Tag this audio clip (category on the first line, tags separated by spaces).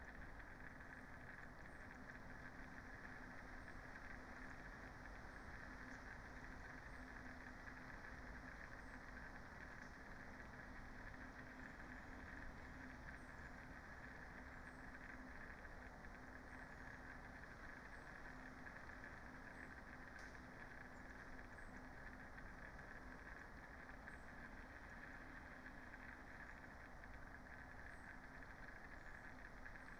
Soundscapes > Nature
sound-installation data-to-sound field-recording phenological-recording soundscape natural-soundscape raspberry-pi weather-data Dendrophone artistic-intervention alice-holt-forest modified-soundscape nature